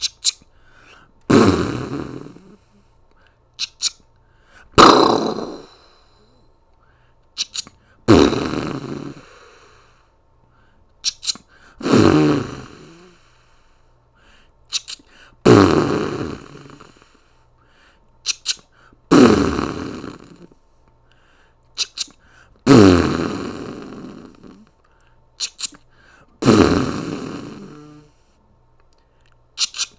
Sound effects > Other
TOONMisc-Blue Snowball Microphone, CU Gun, Cocking, Firing, Comical Nicholas Judy TDC
A cartoon gun cocking and firing.
cock, Blue-brand, Blue-Snowball, gun, cartoon, fire